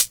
Instrument samples > Synths / Electronic
606ModHH OneShot 05
Modified, 606, DrumMachine, Bass, Drum, HiHat, Synth, music, Analog, Electronic, Vintage, Mod, Kit